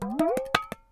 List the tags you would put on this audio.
Sound effects > Objects / House appliances
bonk
clunk
fieldrecording
foley
foundobject
fx
hit
industrial
mechanical
metal
natural
object
oneshot
percussion

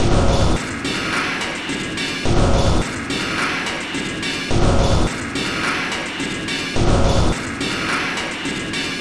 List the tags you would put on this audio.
Instrument samples > Percussion
Dark
Samples
Underground
Loopable
Ambient
Drum
Loop
Soundtrack
Weird
Packs
Alien
Industrial

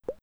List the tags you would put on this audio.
Soundscapes > Nature
Bubble
Bubbles
Pop
Water

